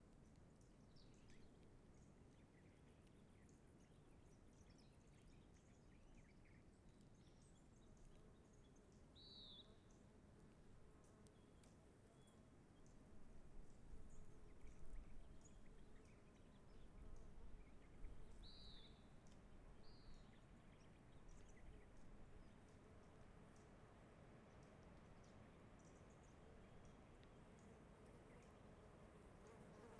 Soundscapes > Nature
AMBGras Distant Wind, Birds, Insects ShaneVincent PCT25 20250731
Light wind, birds, insects, and general forest/grassland ambience This recording, along with the others in this pack, were taken during a 50-day backpacking trip along a 1000 mile section of the Pacific Crest Trail during the summer of 2025. Microphone: AKG 214 Microphone Configuration: Stereo AB Recording Device: Zoom F3 Field Recorder
forest, wind, field-recording, birds, insects